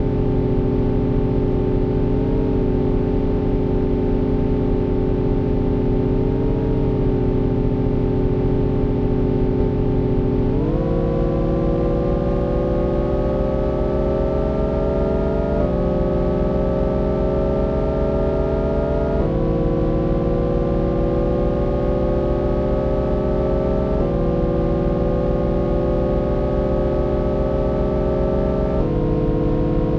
Sound effects > Vehicles
Orion 07.501 bus engine (Synthesizer recreation)
This is not an audio recording. This is supposed to sound like a Detroit Diesel S50 EGR engine. Made this a long time ago so it's probably not as good as my newer sounds.
07, 7, detroit, engine, mississauga, miway, motor, orion, synth, transit, transmission, vii